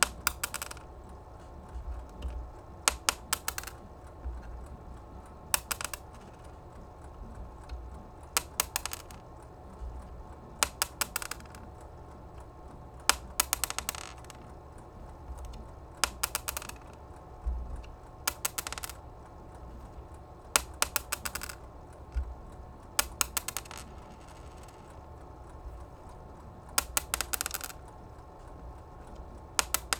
Sound effects > Objects / House appliances
PLASImpt-Blue Snowball Microphone, MCU Button, Drop Nicholas Judy TDC
A button being dropped.